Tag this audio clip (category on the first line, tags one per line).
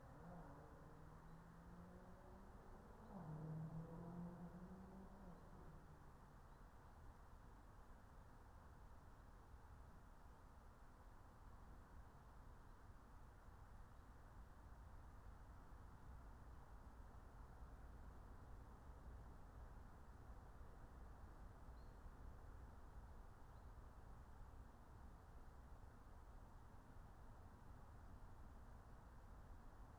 Soundscapes > Nature
meadow
soundscape
phenological-recording
alice-holt-forest
field-recording
natural-soundscape
nature
raspberry-pi